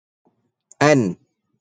Sound effects > Other
nun-sisme
sound, vocal, male, voice, arabic